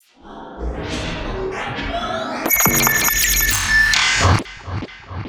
Sound effects > Experimental
Glitch Percs 6 burst into
whizz, idm, hiphop, pop, lazer, otherworldy, edm, fx, crack, glitchy, clap, sfx, impacts, laser, snap, zap, experimental, alien, glitch, impact, abstract, percussion, perc